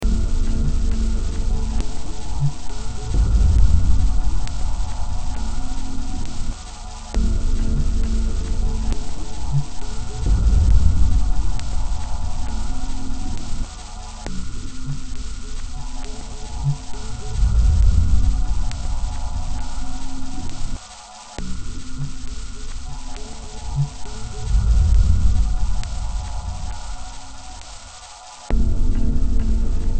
Music > Multiple instruments
Demo Track #3876 (Industraumatic)
Noise, Games, Sci-fi, Soundtrack, Cyberpunk, Ambient, Horror, Underground, Industrial